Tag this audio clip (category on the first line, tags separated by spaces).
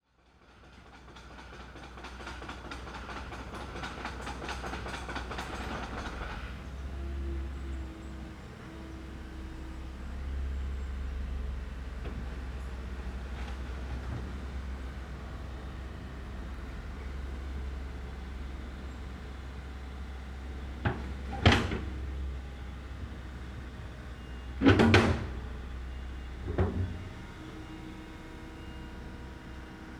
Soundscapes > Urban
machinery beeps vehicles field recording ambience wind construction